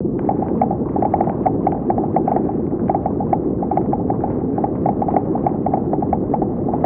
Sound effects > Objects / House appliances
Hi ! That's not recording sound :) I synth it with phasephant!